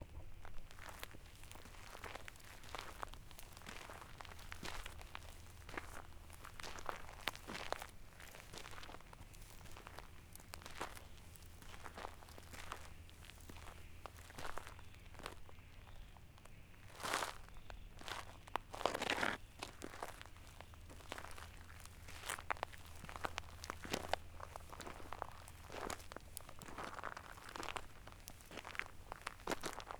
Sound effects > Human sounds and actions
GRAVEL WALK 02
Some steps on small gravel / dust road at night. low level ambient sounds also discernible. Unprocessed sound made with Zoom recorder